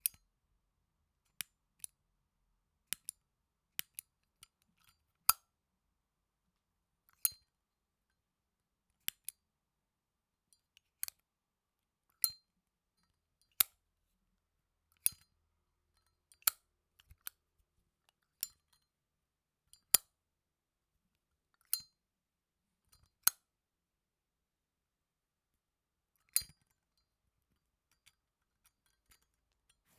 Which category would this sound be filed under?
Sound effects > Objects / House appliances